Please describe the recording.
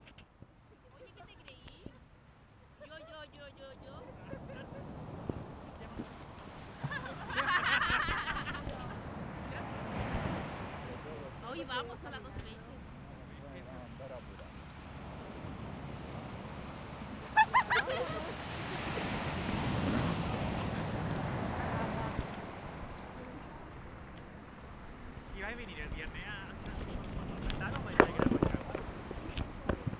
Soundscapes > Urban
Sea waves crashing on some south american shore
field-recording, people